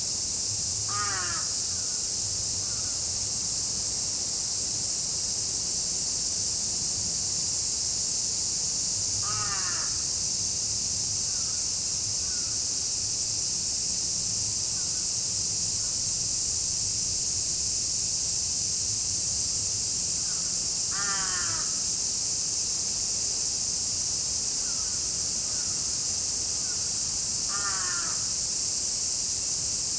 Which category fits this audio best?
Soundscapes > Urban